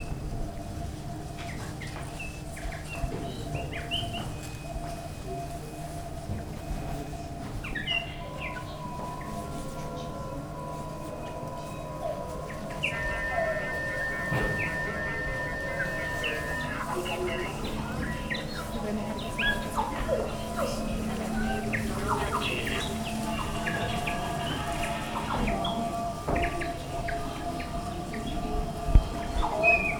Soundscapes > Indoors
Birds and Human Voices - Biennale Exhibition Venice 2025
A mix of birds singing and experimental sounds which are drone-like, metallic and low frequency Sound recorded while visiting Biennale Exhibition in Venice in 2025 Audio Recorder: Zoom H1essential